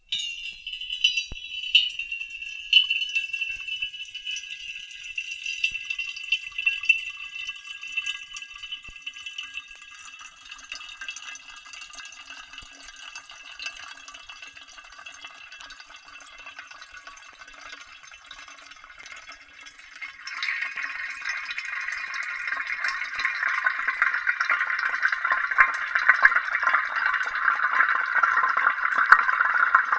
Sound effects > Experimental
contact mic in metal thermos, fill slowly with metallic ring1
Water slowly filling a giant thermos with some hitting the side of it to create this ringing sound recorded with a contact microphone.
contact-mic, contact-microphone, experimental, thermos, water, water-bottle